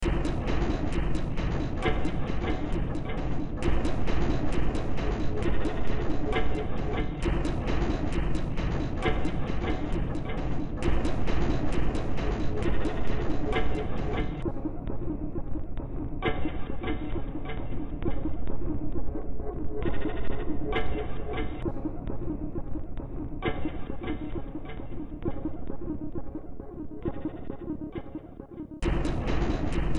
Music > Multiple instruments
Demo Track #3486 (Industraumatic)
Track taken from the Industraumatic Project.